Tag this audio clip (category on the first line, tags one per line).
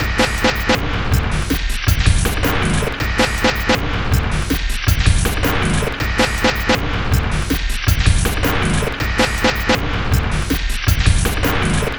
Instrument samples > Percussion
Drum
Underground
Alien
Packs
Samples
Soundtrack
Dark
Ambient
Loop
Loopable
Industrial
Weird